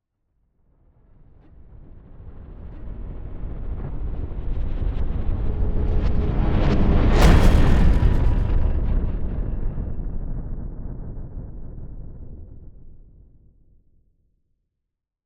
Sound effects > Other
Sound Design Elements SFX PS 071
bass, boom, cinematic, deep, effect, epic, explosion, game, hit, impact, implosion, indent, industrial, metal, movement, reveal, riser, stinger, sub, sweep, tension, trailer, transition, video, whoosh